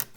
Sound effects > Other mechanisms, engines, machines
metal shop foley -194
bam, bang, boom, bop, crackle, foley, fx, knock, little, metal, oneshot, perc, percussion, pop, rustle, sfx, shop, sound, strike, thud, tink, tools, wood